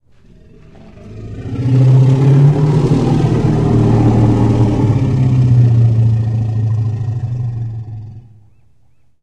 Sound effects > Animals
Cave Monster Deep Growl
Processed growls were made from an elephant growl.
Creature, Snarl, Fantasy, Monster, Animal, Cave, Scary, Deep, Roar, Growl